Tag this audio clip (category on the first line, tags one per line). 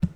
Sound effects > Objects / House appliances
slam
metal
pour
handle
foley
container
plastic
water
pail
hollow
clang
knock
kitchen
carry
fill
lid
shake
tool
liquid
drop
garden
household
debris
tip
spill
object
scoop
cleaning
bucket
clatter